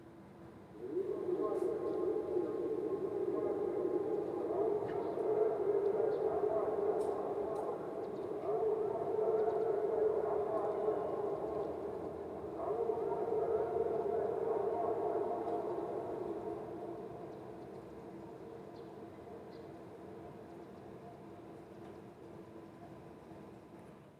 Speech > Processed / Synthetic
Russian Civil Defense Announcement — Missile Threat Canceled
Announcement of cancellation of missile threat. Recorded on a phone in Belgorod on 09/24/2024. Transcript: "Внимание! Внимание! Отбой ракетной опасности! Отбой ракетной опасности! Отбой ракетной опасности!" Translation: "Attention! Attention! All clear from missile threat! All clear from missile threat! All clear from missile threat!"
announcement, voice, missile, alert, defense, threat, civil, canceled, russian, defence, warning